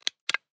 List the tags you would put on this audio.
Sound effects > Human sounds and actions
activation
button
click
interface
off
switch
toggle

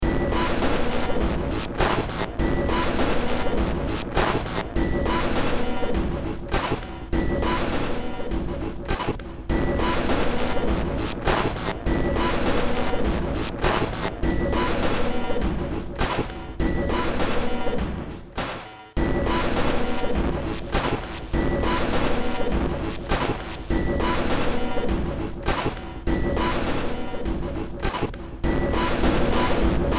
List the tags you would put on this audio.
Music > Multiple instruments
Horror
Sci-fi
Soundtrack
Industrial
Ambient
Noise
Games
Cyberpunk
Underground

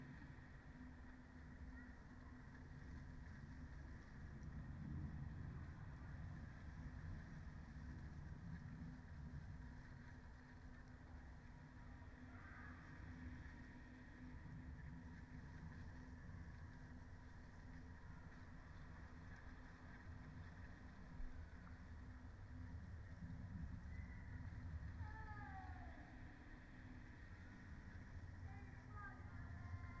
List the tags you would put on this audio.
Soundscapes > Nature
nature
alice-holt-forest

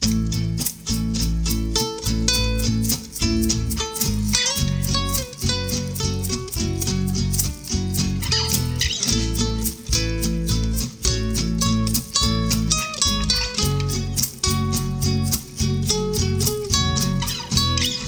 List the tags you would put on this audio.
Music > Multiple instruments
guitar
licks
pattern
sequence

